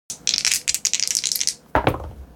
Sound effects > Objects / House appliances
Dice Clacking Together and Rolling into a Soft Tray
Clacking two six-sided dice together in hand and rolling into a soft tray.